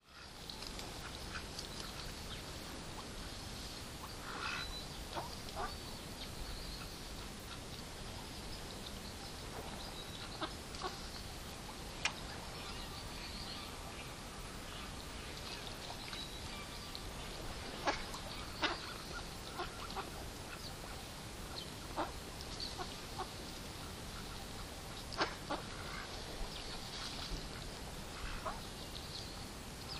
Soundscapes > Nature
Panormos Bay lake with ducks
Atmospheric recording of a lake and its inhabitants, the ducks. Some birds can be heard as well. This is a 16-bit stereo recording. Recorder used: Olympus LS-11 linear PCM recorder.
Bay, birds, field-recording, Greece, lake, Mediterranean, morning, nature, Panormos, water